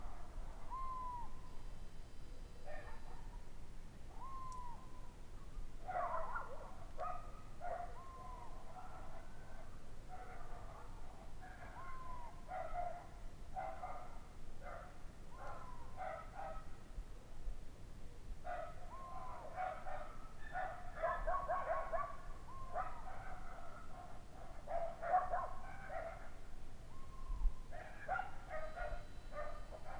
Soundscapes > Nature
Ambience Night StrangeWithDogsBirdWhistle
Record - Zoom h1n. I was interested in bird sound at night, and decided to recorded it part 1